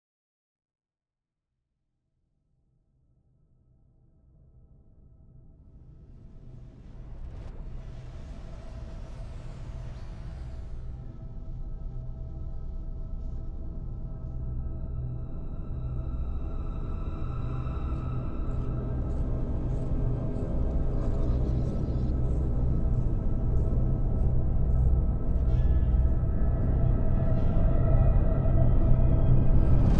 Sound effects > Other
Long Riser Hit PS-001
build-up, cinematic, climax, crescendo, dramatic, effect, electronic, falling, hit, hybrid, impact, long, loud, powerful, riser, rising, sound, suspense, sweeping, swell, tension, trailer, transition, whoosh